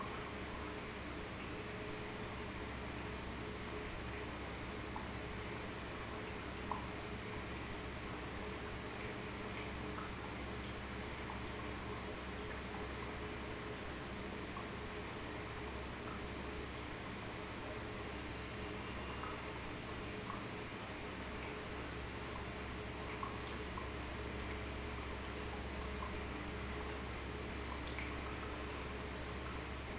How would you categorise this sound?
Soundscapes > Indoors